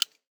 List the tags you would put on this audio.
Sound effects > Human sounds and actions
toggle switch click off interface activation button

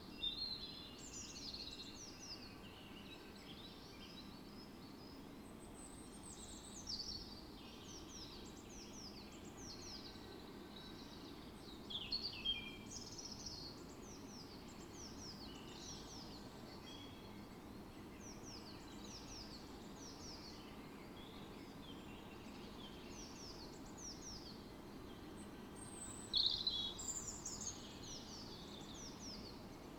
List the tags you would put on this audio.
Soundscapes > Nature
raspberry-pi phenological-recording artistic-intervention weather-data alice-holt-forest Dendrophone modified-soundscape field-recording natural-soundscape nature soundscape sound-installation data-to-sound

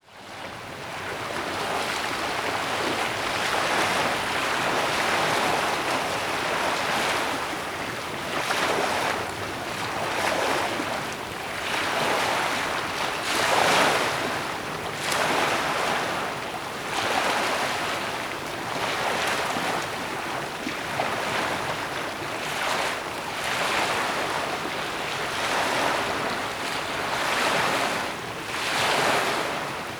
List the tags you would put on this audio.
Soundscapes > Nature
shore shoreline water

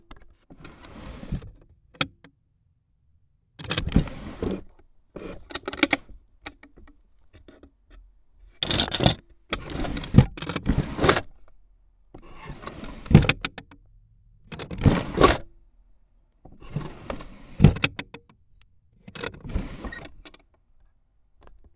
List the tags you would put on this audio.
Sound effects > Objects / House appliances
drawer dresser open

